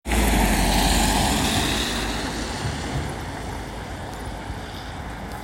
Sound effects > Vehicles
car sunny 14
A recording of a car passing by on Insinöörinkatu 41 in the Hervanta area of Tampere. It was collected on November 12th in the afternoon using iPhone 11. The weather was sunny and the ground was dry. The sound includes the car engine and the noise from the tires on the dry road.
engine, vehicle, car